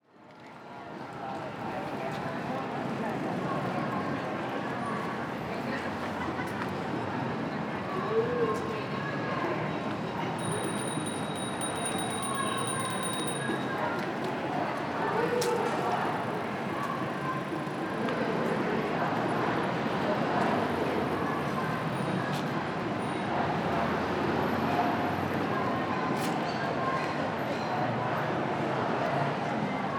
Soundscapes > Urban
A massive crowd of people marching through Portland as part of the No Kings protests across the US in response to the abhorrent policies of the christofascist trump administration.
crowd, people, field-recording, urban, fuck-trump, cheer, city, outside, protest, ambience, loud, abolish-ice, demonstration, noise